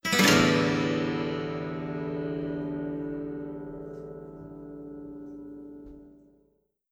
Music > Solo instrument
MUSCPluck-Samsung Galaxy Smartphone, MCU Guitar, Strum, Descending Nicholas Judy TDC
A descending guitar strum.
descending, guitar, Phone-recording, strum